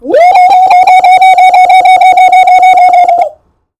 Sound effects > Human sounds and actions
TOONVox-Blue Snowball Microphone, CU Indian, War Whoop Nicholas Judy TDC
An indian war whoop.